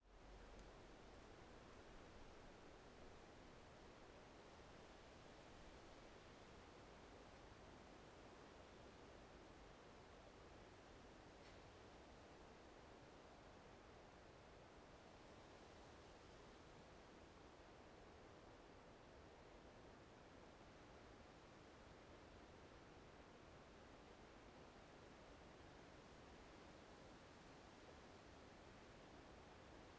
Soundscapes > Nature
Ambient. Autumn evening. Grasshoppers, the tree creaks. The wind, birds
treecreaks, windy